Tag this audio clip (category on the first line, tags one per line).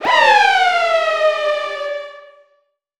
Sound effects > Vehicles
Cars
Passing
Road